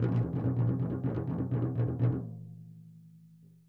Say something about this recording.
Music > Solo percussion
med low tom-loose roll fill 12 inch Sonor Force 3007 Maple Rack
acoustic, beat, drum, drumkit, drums, flam, kit, loop, maple, Medium-Tom, med-tom, oneshot, perc, percussion, quality, real, realdrum, recording, roll, Tom, tomdrum, toms, wood